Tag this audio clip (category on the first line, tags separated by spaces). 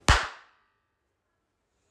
Sound effects > Human sounds and actions
clap echo flutter reverb